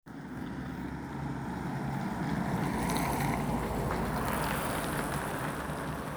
Soundscapes > Urban
voice 14-11-2025 12 car
Car, vehicle